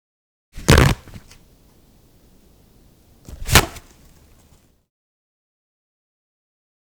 Sound effects > Objects / House appliances
paper-towel-tearing
A sheet of paper towel being torn. Recorded with Zoom H6 and SGH-6 Shotgun mic capsule.
kitchen, tear, towel, paper